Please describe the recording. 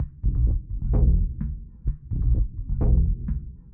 Music > Solo percussion
Dark banjo loop in E
atmosphere, banjo